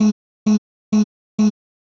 Speech > Solo speech
BrazilFunk Vocal Chop One-shot 16 130bpm
BrazilFunk, FX, One-shot, Vocal